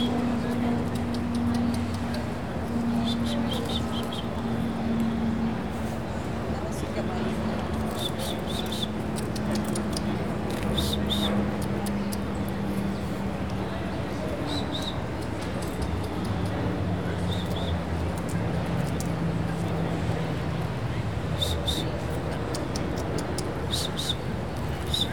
Soundscapes > Urban
Woman feeding squirrels
Woman calling squirrels to give them peanuts
woman, park, walk, recording, field